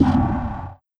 Sound effects > Electronic / Design
Incorrect UI
The selection you've made was incorrect. Another game SFX sound I made years ago. Some modulated voice I likely did. Makes for a great game sound effect.
electronic, failure, fail, sfx, wrong, mistake, sound-design, ui, beep, incorrect, error, digital, glitch, negative